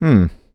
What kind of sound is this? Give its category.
Speech > Solo speech